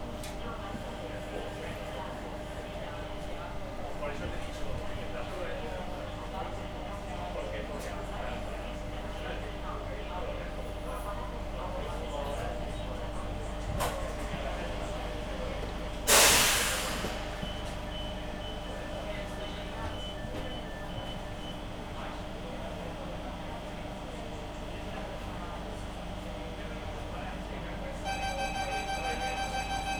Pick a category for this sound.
Soundscapes > Urban